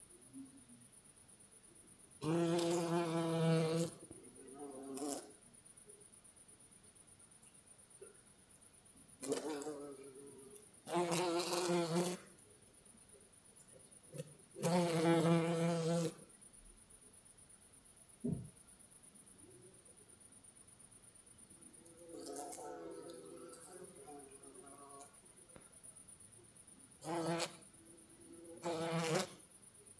Soundscapes > Nature
The Flies
🎙️ Details: A unique recording of the most annoying flies buzzing captured around an indoor light bulb. This irritating soundscape perfectly captures that maddening buzz we all know and hate - flies relentlessly circling a bright bulb in an endless, nerve-wracking loop.
insects, flies, soundscape, nature, annoying, buzzling, field-recording